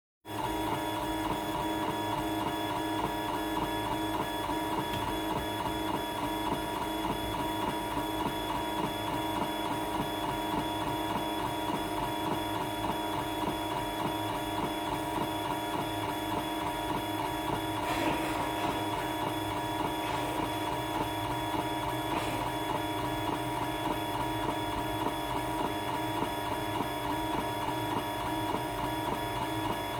Sound effects > Other mechanisms, engines, machines
PLA 3d Printer by TamOcello - monoprice ultimate 3 (printer) - pixel 9 pro xl (recorder)
Uploading on behalf of TamOcello. A monoprice ultimate 3 printing one layer. Recorded with a Pixel 9 pro Xl, google recorder app, placed on-top of the printer. You don't have to credit, but if you do, please include : PLA 3d Printer by TamOcello.
3d-printer, Indoor, Ontop, phone-recording, Pixel9, Pixel-9-pro-xl, PLA, Single-layer, TamOcello